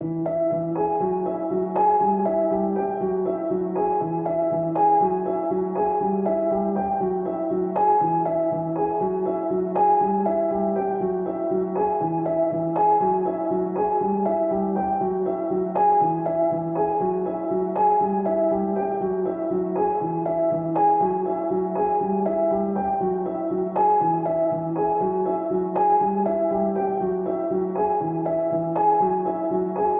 Music > Solo instrument
Piano loops 134 efect 4 octave long loop 120 bpm
Beautiful piano music . VST/instruments used . This sound can be combined with other sounds in the pack. Otherwise, it is well usable up to 4/4 120 bpm.
120, 120bpm, free, loop, music, piano, pianomusic, reverb, samples, simple, simplesamples